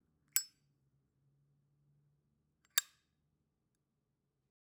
Sound effects > Objects / House appliances
OBJMisc Zippo Lighter Opening Closing Inside No Ignition Usi Pro AB RambleRecordings
This is the sound of a Zippo lighter opening and closing. The lighter does not get lit, check out my other recordings for the sound of this lighter opening, lighting, and then closing. The tube was held about 10cm in front of the mics. This was recorded in my apartment, which is a large room with brick walls, concrete floors, and a wooden ceiling.